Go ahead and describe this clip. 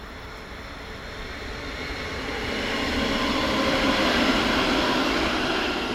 Sound effects > Vehicles
Tram 2025-10-27 klo 20.13.01
Sound recording of a tram passing by. Recording done in Hallilan-raitti, Hervanta, Finland near the tram line. Sound recorded with OnePlus 13 phone. Sound was recorded to be used as data for a binary sound classifier (classifying between a tram and a car).
Finland, Tram